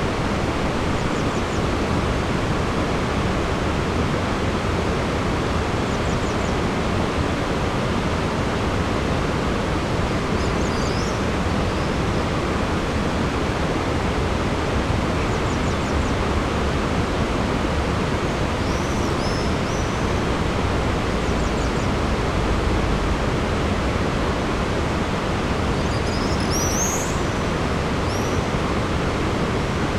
Sound effects > Natural elements and explosions
Dam recording - 250607 06h49 Albi Pont du 22 aout 1944
Subject : Recording the Dam in Albi from the big bridge "Pont du 22 aout 1944" Date YMD : 2025 06 07 (Saturday). Early morning. Time = 06h49 ish Location : Albi 81000 Tarn Occitanie France. Hardware : Tascam FR-AV2, Rode NT5 with WS8 windshield. Had a pouch with the recorder, cables up my sleeve and mic in hand. Weather : Grey sky. Little to no wind, comfy temperature. Processing : Trimmed in Audacity. Other edits like filter, denoise etc… In the sound’s metadata. Notes : An early morning sound exploration trip. I heard a traffic light button a few days earlier and wanted to record it at a calmer time.
Outdoor, hand-held, Mono, white-noise, Tascam, WS8, City, Occitanie, Early-morning, urbain, NT5, Wind-cover, France, 2025, morning, FR-AV2, Rode, dam, Albi, Saturday, water, Early, Tarn, noise, Single-mic-mono, 81000, handheld